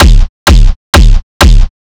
Sound effects > Other
An aggressive kick, that even sound player can't hold it, my own sampling :)